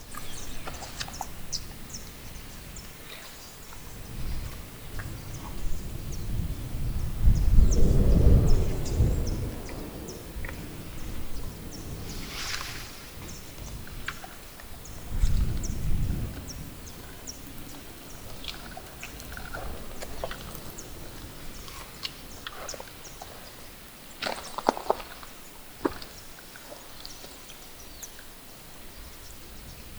Soundscapes > Nature
Poás Volcano Wildtrack
This ambience was recorded next to the volcano's crater.